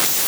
Instrument samples > Synths / Electronic
databent open hihat 4
A databent open hihat sound, altered using Notepad++
percussion, databending, glitch